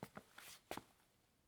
Sound effects > Human sounds and actions
footsteps, tile, shufle2
foley, footsteps, shuffling, tile